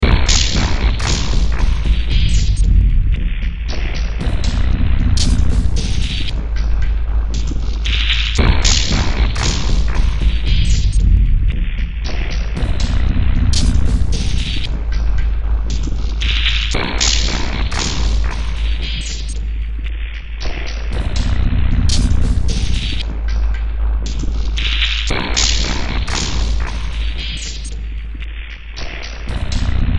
Music > Multiple instruments
Demo Track #3872 (Industraumatic)
Noise, Games, Cyberpunk, Sci-fi, Soundtrack, Industrial, Ambient, Underground, Horror